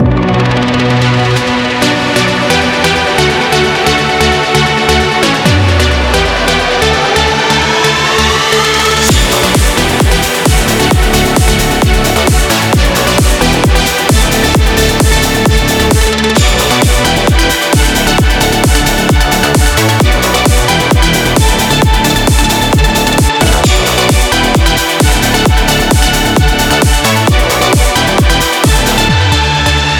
Music > Multiple instruments
Upbeat Trance Melody (JH)
rave, upbeat, Music, dance, club, trance, drop